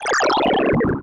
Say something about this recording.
Sound effects > Other
apply debuff
7 - Apply a debuff Synthesized using ChipTone, edited in ProTools
effect, status, debuff